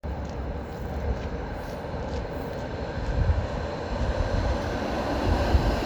Soundscapes > Urban
A tram passing the recorder in a roundabout. The sound of the tram and sound of steps on a sidewalk can be heard. Recorded on a Samsung Galaxy A54 5G. The recording was made during a windy and rainy afternoon in Tampere.